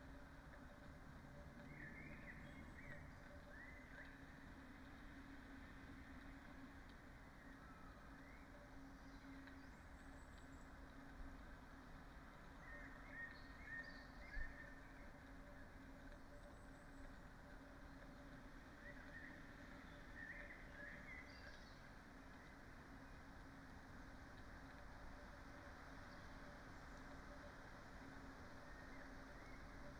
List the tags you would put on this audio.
Soundscapes > Nature
sound-installation
phenological-recording
artistic-intervention
Dendrophone
nature
weather-data

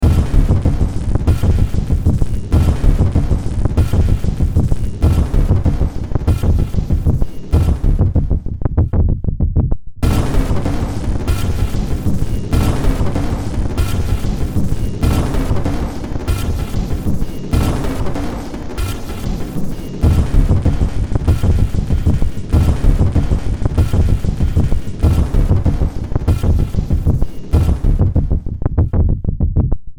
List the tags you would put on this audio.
Music > Multiple instruments
Horror
Ambient
Games
Sci-fi
Noise
Cyberpunk
Soundtrack
Underground
Industrial

